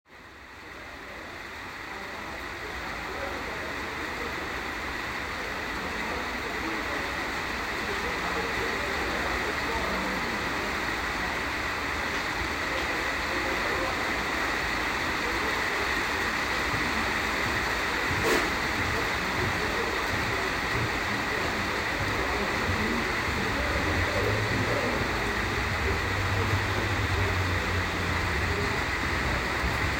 Soundscapes > Urban
Hackney Downs Overground in the rain.
Rain on the roof of Hackney Downs Overground train station. 13/1/26
underground, london, rainambience, field-recording, Overground, roof, tube, londonrain, rain, train